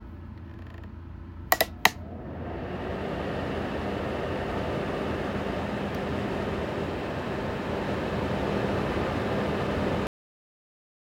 Sound effects > Electronic / Design
heater turn on
starting the heater.
heater, start, motor, machine